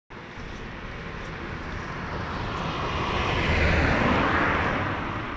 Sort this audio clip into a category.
Sound effects > Vehicles